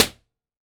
Soundscapes > Other
I&R-Cellar Albi - Corner (doorway entrance mic and balloon pop)
Subject : Recording a Impulse and response of my home using a omni mic and poping a balloon. Here the corner of my cellar, microphone head hight and poping the balloon about 50cm under it. Despite being 80% undergraound and concrete there isn't that much reverb, the ceiling is wood. Date YMD : 2025 July 07 Location : Albi 81000 Tarn Occitanie France. Superlux ECM-999 Weather : Processing : Trimmed, very short fade-in and a fade-out in Audacity, normalised.